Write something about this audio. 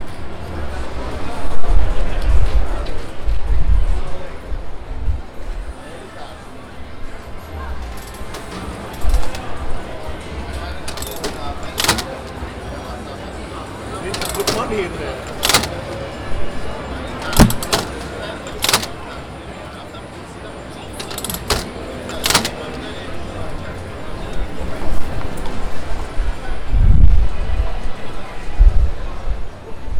Soundscapes > Urban
Las Vegas Casino Slot Machines 1
Typical Las Vegas Casino Slot Machines sound.
casino, slot-machine, slot